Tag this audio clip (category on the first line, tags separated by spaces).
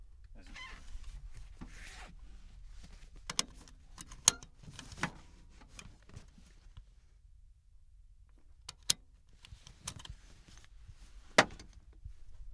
Sound effects > Vehicles
115 2003 2003-model 2025 A2WS August Ford Ford-Transit France FR-AV2 Mono Old Single-mic-mono SM57 T350 Tascam Van Vehicle